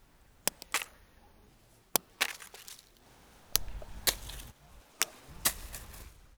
Sound effects > Natural elements and explosions
falling cone leaves light
Falling cone to the leaves lightly
forest, cone